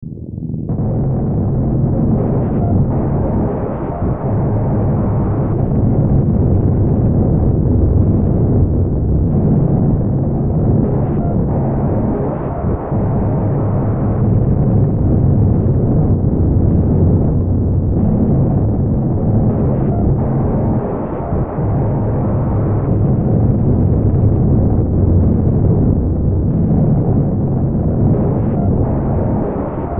Soundscapes > Synthetic / Artificial
Looppelganger #168 | Dark Ambient Sound
Ambience, Darkness, Drone, Gothic, Horror, Sci-fi, Soundtrack, Survival, Underground